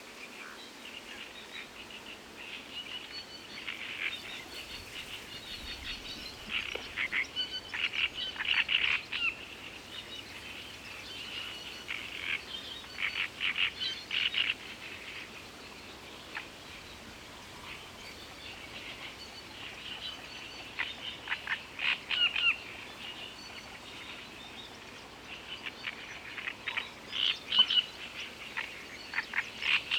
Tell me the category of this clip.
Soundscapes > Nature